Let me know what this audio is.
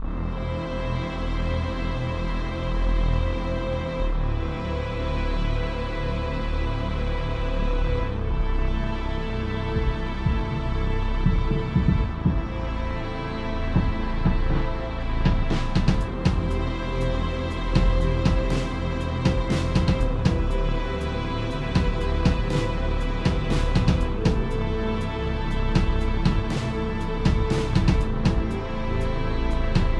Music > Multiple instruments
Dark & Melancholic Instrumental Music
Made with FL Studio using LABS and GMS plugins.
keys, instrumental, melody, music, game, dark, pad, drums, strings